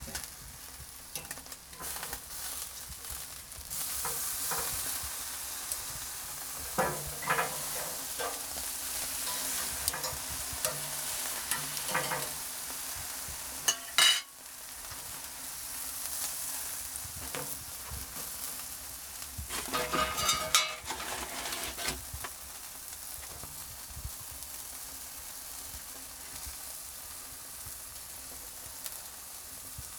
Soundscapes > Indoors
Barbecue - 2 (longer version)
The sound of meat sizzling on the barbecue grill, the tongs moving and touching the plate. Recorded with a Zoom H1essential.
burn, grill, meat, cook, fire, bacon, sizzle, barbecue, smoke, hot, burning